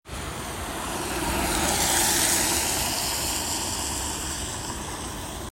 Sound effects > Vehicles
car rain 01
A recording of a car passing by on Insinöörinkatu 30 in the Hervanta area of Tampere. It was collected on November 7th in the afternoon using iPhone 11. There was light rain and the ground was slightly wet. The sound includes the car engine and the noise from the tires on the wet road.
car, engine, rain, vehicle